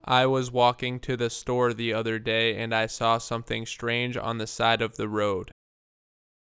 Speech > Solo speech
A male speech sample
Voice, Male, Speech